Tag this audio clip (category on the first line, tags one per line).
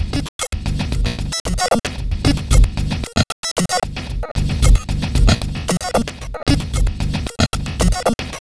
Sound effects > Experimental
Alien
Ambient
Dark
Drum
Industrial
Loop
Loopable
Packs
Samples
Soundtrack
Underground
Weird